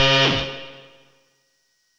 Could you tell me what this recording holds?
Instrument samples > Synths / Electronic
Benjolon 1 shot15
DIY, 1SHOT, DRUM, SYNTH, CHIRP, NOISE, ELECTRONICS, BENJOLIN